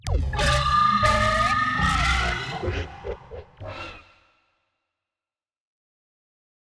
Sound effects > Experimental
Creature Monster Alien Vocal FX-6
Ominous, Monstrous, visceral, Fantasy, demon, Deep, gutteral, Growl, Creature, Groan